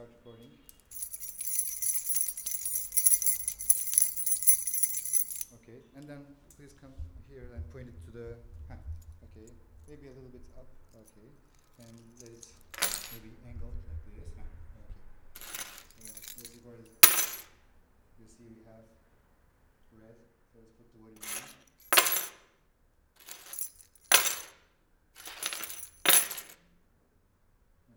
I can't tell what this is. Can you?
Sound effects > Objects / House appliances
Raw recording of a keychain rattles, shakes, drops, and grabs on a table, inside a classroom. Recording was done through Roland R26 recording device, using an AKG P220 large diaphragm condenser microphone.
shake, drop, grab